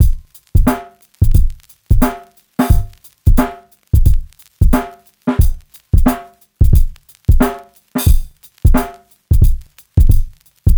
Music > Solo percussion
bb drum break loop warm 89
Breakbeat, Drum, Vintage, DrumLoop, Break, Dusty, Lo-Fi, Drum-Set, Drums, Vinyl, Acoustic